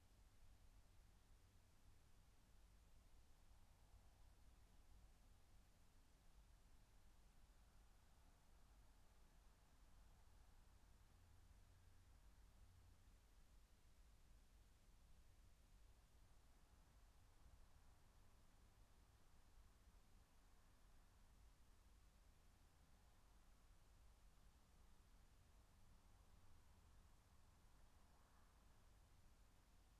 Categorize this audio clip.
Soundscapes > Nature